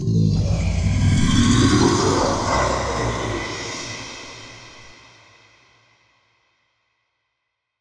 Sound effects > Experimental
Creature Monster Alien Vocal FX (part 2)-022
Alien, bite, Creature, demon, devil, dripping, fx, gross, grotesque, growl, howl, Monster, mouth, otherworldly, Sfx, snarl, weird, zombie